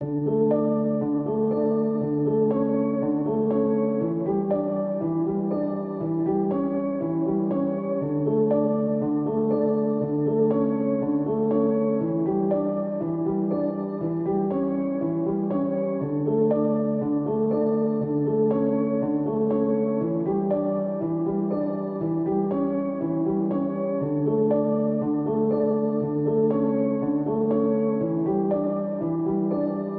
Music > Solo instrument
Piano loops 186 efect 4 octave long loop 120 bpm
120bpm, simple, loop, 120, pianomusic, music, piano, free, samples, reverb